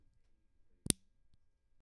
Sound effects > Objects / House appliances
Lightswitch sfx
Light Switch Six
click, light, switch